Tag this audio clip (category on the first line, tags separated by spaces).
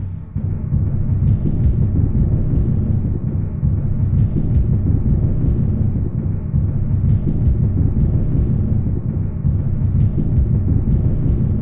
Soundscapes > Synthetic / Artificial
Alien Ambient Dark Drum Industrial Loop Loopable Packs Samples Soundtrack Underground Weird